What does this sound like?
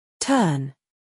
Speech > Solo speech
to turn
english, pronunciation